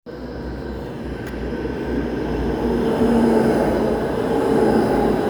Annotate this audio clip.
Soundscapes > Urban
voice 4 17-11-2025 tram
TramInTampere, Tram, Rattikka